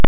Sound effects > Experimental
click undecillion
a lil error that happened while recording an audio file cuz my mic felt like it ig.